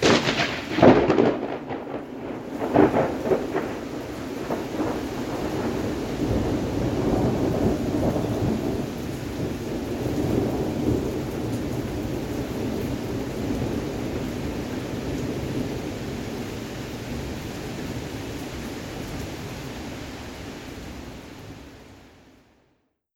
Sound effects > Natural elements and explosions
THUN-Samsung Galaxy Smartphone, CU Lightning, Strike, Booming Thunder Roll Nicholas Judy TDC
A lightning striking, then booming thunder roll. Heavy rain in background.